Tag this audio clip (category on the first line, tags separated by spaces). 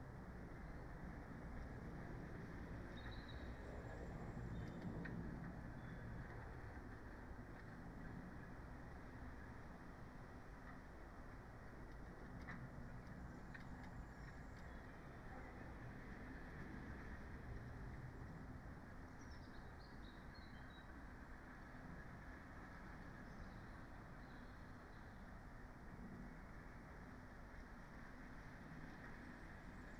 Soundscapes > Nature
artistic-intervention data-to-sound nature weather-data